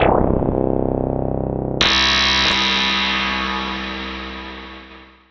Instrument samples > Synths / Electronic
bass stabs drops bassdrop sub subs subbass subwoofer low lowend clear wobble lfo wavetable synthbass synth